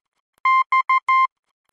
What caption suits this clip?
Sound effects > Electronic / Design
A series of beeps that denote the letter X in Morse code. Created using computerized beeps, a short and long one, in Adobe Audition for the purposes of free use.